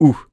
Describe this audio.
Speech > Solo speech
Hurt - Oof 2
Human, Man, Neumann, oneshot, singletake, talk, U67, Video-game, Vocal, voice